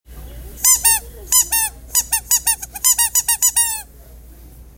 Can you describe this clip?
Sound effects > Objects / House appliances
Squishing dog toy.